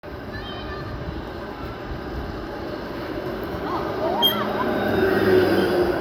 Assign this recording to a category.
Soundscapes > Urban